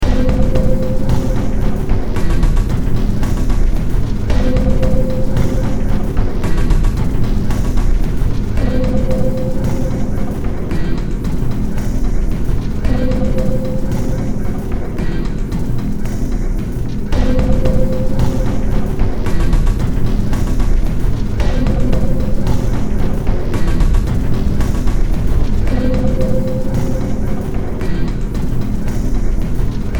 Music > Multiple instruments
Demo Track #3977 (Industraumatic)
Noise, Underground, Games, Soundtrack